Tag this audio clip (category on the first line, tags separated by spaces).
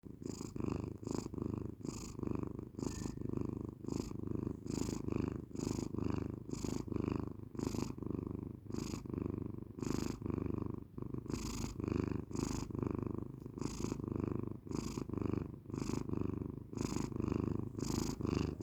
Sound effects > Animals
Cat Animal Sleep